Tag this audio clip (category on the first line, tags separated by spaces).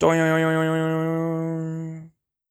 Speech > Solo speech
boing Phone-recording vocal